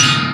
Instrument samples > Percussion
Unrealistic bass shortlengthed bellride for fast music.
Agean, Amedia, bassbell, bell, bellcup, bellride, Bosphorus, click-crash, crashcup, Crescent, cup, cupride, cymbal, cymbell, Diril, Hammerax, Istanbul, Istanbul-Agop, Mehmet, Meinl, metal-cup, Paiste, ping, ride, ridebell, Sabian, Soultone, Stagg, Zildjian